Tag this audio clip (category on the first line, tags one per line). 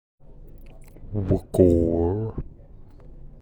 Speech > Solo speech
male
vocal
voice